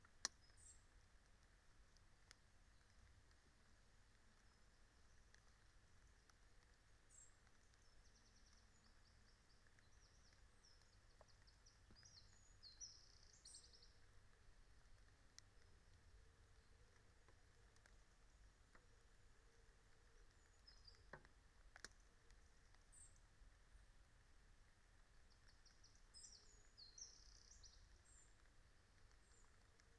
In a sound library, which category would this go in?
Soundscapes > Nature